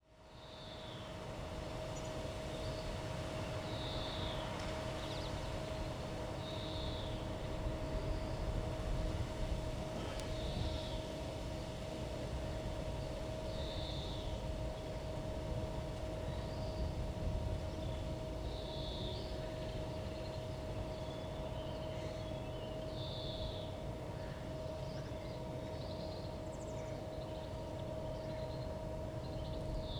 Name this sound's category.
Soundscapes > Urban